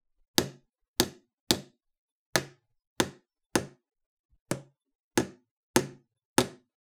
Sound effects > Objects / House appliances
Banging a Sieve on a Desk
Banging the edge of my long-suffering but great-sounding sieve on a desk.